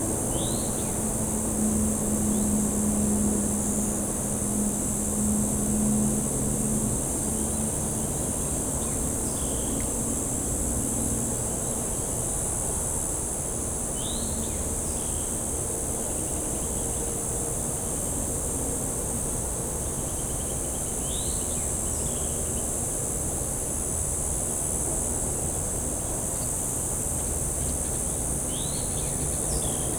Soundscapes > Nature
Along the bike trail in Gulf State Park, Gulf Shores, Alabama, 6AM. birdsong, crickets, insects.